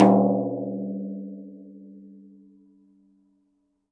Music > Solo instrument
Mid low Tom Sonor Force 3007-004
Crash, Cymbal, Drum, Drums, FX, GONG, Hat, Kit, Metal, Oneshot, Paiste, Perc, Percussion, Ride, Sabian